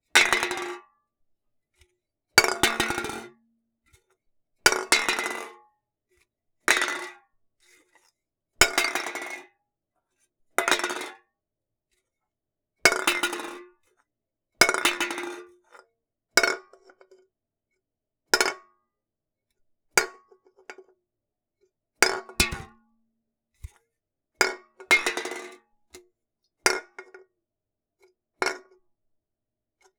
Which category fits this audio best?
Sound effects > Objects / House appliances